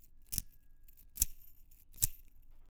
Sound effects > Objects / House appliances
Lighter noise made from a metal keychain rubbing on metal chain necklace